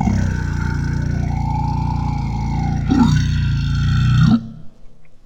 Sound effects > Experimental
Creature Monster Alien Vocal FX-38
Alien, Animal, boss, Creature, Deep, demon, devil, Echo, evil, Fantasy, Frightening, fx, gamedesign, Groan, Growl, gutteral, Monster, Monstrous, Ominous, Otherworldly, Reverberating, scary, sfx, Snarl, Snarling, Sound, Sounddesign, visceral, Vocal, Vox